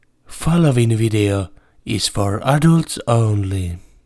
Speech > Solo speech
Following video is for adults only (calm voice)
male, calm